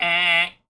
Sound effects > Human sounds and actions
TOONVox-Blue Snowball Microphone, CU Vocal Buzzer, Tonal Nicholas Judy TDC
A tonal vocal buzzer.